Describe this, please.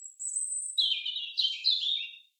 Sound effects > Animals
A recording of a robin and a Blackcap. Edited using RX11.